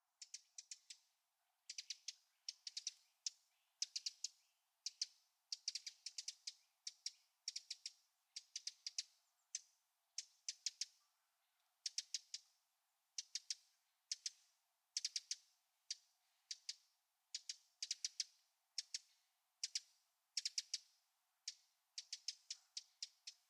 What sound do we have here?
Sound effects > Animals
eurasian wren alarm (close)
Eurasian/northern wren alarm call. Recorded from 1.5m distance. Location: Poland Time: November 2025 Recorder: Zoom H6 - SGH-6 Shotgun Mic Capsule
alarm-signal, nature, alarm, forest, northen-wren, alarm-call, bird, wren, field-recording, euroasian-wren, single, call